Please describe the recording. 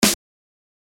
Instrument samples > Percussion
Ableton Live.Simple VST.Fury-800......Snare Free Music Slap House Dance EDM Loop Electro Clap Drums Kick Drum Snare Bass Dance Club Psytrance Drumroll Trance Sample .
Music, Electro, House, Drums, Loop, Clap, Snare, Slap, Kick, Drum, Free, Bass, Dance, EDM